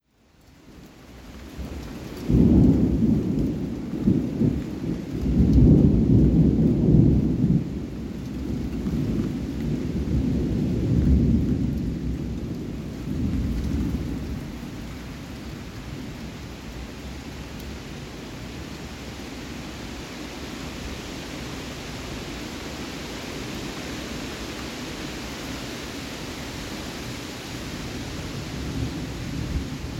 Sound effects > Natural elements and explosions
THUN-Samsung Galaxy Smartphone, CU Thunderclap, Boomer, Rumble, Heavy Staticish Rain Nicholas Judy TDC
A single thunderclap, boomer and rumble with distant heavy rain static.
boomer, clap, distant, heavy, Phone-recording, rain, rumble, single, static, thunder, thunderclap